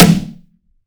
Instrument samples > Percussion
snare brutal 1
deepsnare, drum, grating, gravelly, mainsnare, percussion, rock, scratchy, snare, strike, thrash-metal, timpano